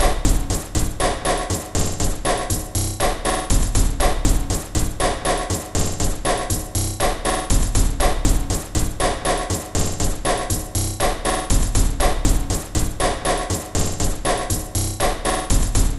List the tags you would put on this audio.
Instrument samples > Percussion
Alien Dark Drum Industrial Loop Loopable Soundtrack Underground

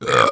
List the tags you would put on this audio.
Sound effects > Human sounds and actions
Body Human Strange

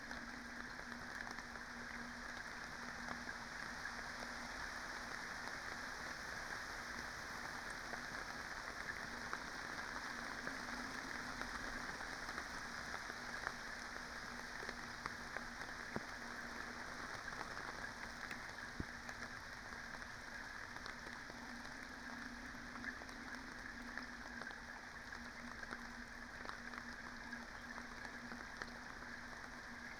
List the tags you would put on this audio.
Soundscapes > Nature
artistic-intervention raspberry-pi weather-data phenological-recording soundscape data-to-sound sound-installation modified-soundscape Dendrophone natural-soundscape alice-holt-forest nature field-recording

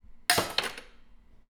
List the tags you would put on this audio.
Sound effects > Objects / House appliances
Bottlecap Hit Impact Metal Recycling Wine

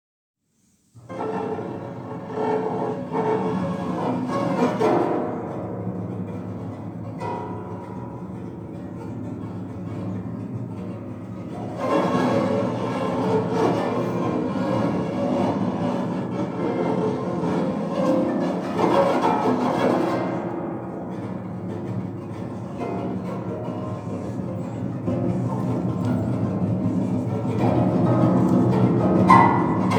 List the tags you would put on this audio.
Sound effects > Experimental
atmosphere battle chaos chaotic chase high horror hunt intense low music perzina piano rubb rubbing scary scratch scratching serious strings unsettling

